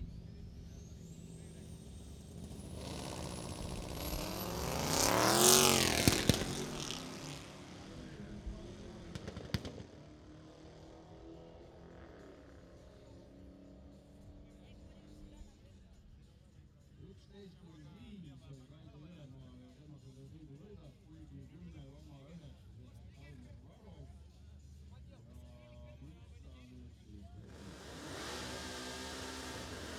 Soundscapes > Other
Wildcards Drag Race 2025 AUDIX D6, DPA 4055 Kick-Drum Microphone Portable audio recorder: Sound Devices MixPre-6 II This particular clip was made using two bass durm microphones to record the drag race.
AUDIX, DPA, Drag, Kiltsi, Race, Wildcards